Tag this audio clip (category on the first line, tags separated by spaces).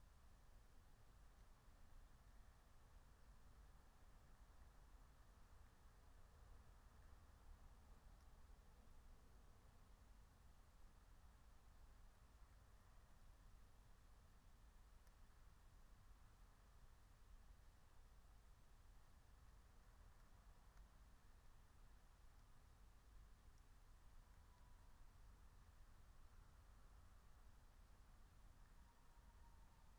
Soundscapes > Nature
raspberry-pi alice-holt-forest